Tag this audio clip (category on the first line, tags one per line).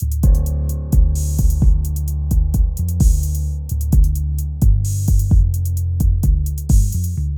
Music > Multiple instruments
trap
drums
808
dark
loop
130bpm